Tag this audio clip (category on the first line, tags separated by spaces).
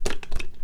Sound effects > Objects / House appliances
clack industrial